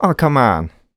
Speech > Solo speech
Annoyed - Oh camman
annoyed, dialogue, FR-AV2, grumpy, Human, Male, Man, Mid-20s, Neumann, NPC, oneshot, singletake, Single-take, talk, Tascam, U67, upset, Video-game, Vocal, voice, Voice-acting